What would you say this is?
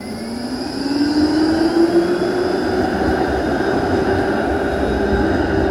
Sound effects > Vehicles
tram sunny 05
motor, sunny, tram